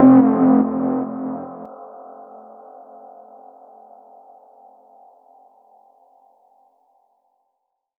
Sound effects > Electronic / Design
Psytrance One Shot 08
Psytrance Sample Packs
goa-trance, audacity, psytrance, psy, goatrance, lead, psy-trance, goa, flstudio